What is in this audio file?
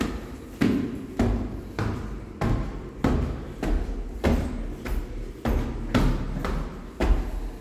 Sound effects > Human sounds and actions
FEETHmn-Samsung Galaxy Smartphone Walking Upstairs, Reverberant Hallway, Looped Nicholas Judy TDC
Someone walking upstairs in a reverberant hallway. Looped.